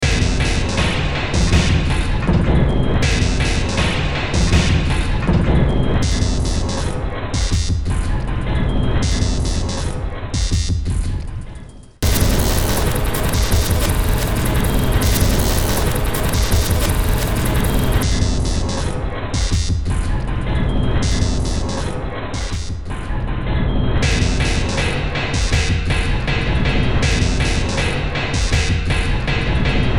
Music > Multiple instruments
Short Track #2979 (Industraumatic)
Ambient, Cyberpunk, Noise, Sci-fi, Soundtrack